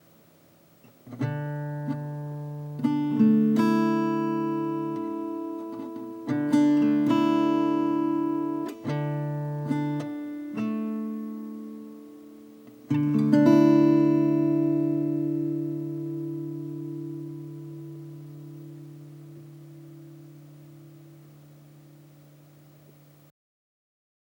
Music > Solo instrument
Dreamy Guitar Chord, Picked and Strumed
Dsus2 chord, learning guitar and liked the way this chord sounded! Love to hear anything you use my sounds in, but not required!
melancholic, sad, guitar, wistful, strum, chord, fingerpick, melancholy, slow, acoustic, cinematic, dreamy